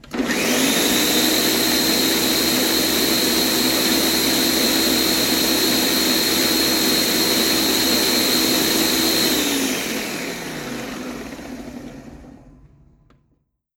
Sound effects > Objects / House appliances
A blender turning off, blending at high speed and turning off.